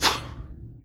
Sound effects > Objects / House appliances
SWSH Vocal, Single Nicholas Judy TDC
A single, vocal swish.
swish, cartoon, foley, vocal, single